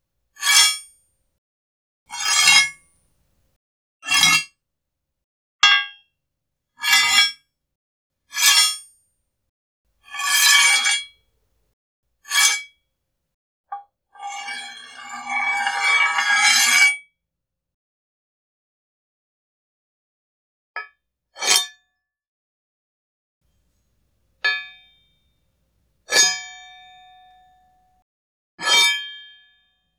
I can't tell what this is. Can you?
Sound effects > Objects / House appliances
custom ufotable inspired excalibur or demon slayer sword slide ring 08272025
a heavy long sword slide with ringout inspired fate/stay night and demon slayer. I use a crowbar sliding on a big crowbar and a brass metal pipe stick slide against a metal crowbar.
fight, weapon, blade, unsheath, duel, big, metallic, longsword, heavy, scrape, slide, anime, battle, staynight, fate, yasomasa, slayer, crowbar, fighting, combat, excalibur, sword, melee, unsheathed, medieval, metal, koyama, draw, attack, demon